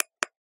Sound effects > Objects / House appliances
Remotecontrol Button 1 Click
Clicking the button of a TV remote control, recorded with a AKG C414 XLII microphone.
button, remote, remote-control